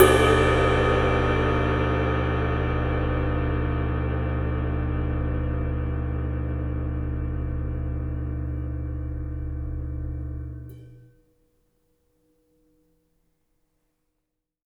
Music > Solo instrument
Paiste 22 Inch Custom Ride-008
22inch, Custom, Cymbal, Cymbals, Drum, Drums, Kit, Metal, Oneshot, Paiste, Perc, Percussion, Ride